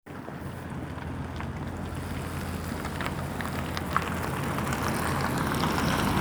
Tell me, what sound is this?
Soundscapes > Urban
voice 14-11-2025 14 car
CarInTampere, vehicle, Car